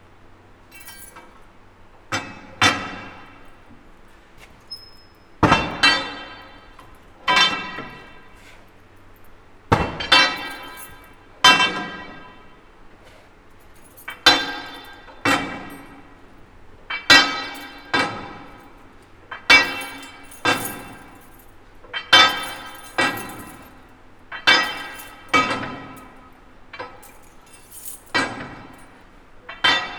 Sound effects > Other mechanisms, engines, machines
clanging wrenches in an engine house
The sounds of a workman resetting the mechanism of a working steam-powered Victorian beam engine. Repeated metallic clanging of a wrench striking cast iron, echoing in the large hall. The wrench can be heard falling to the ground at the end. Recorded with a hand-held Zoom H5, using its standard X/Y microphone capsule.